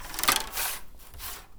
Sound effects > Other mechanisms, engines, machines
metal shop foley -123
tink, tools, perc, bang, crackle, sound, wood, percussion, bop, oneshot, bam, shop, metal, little, thud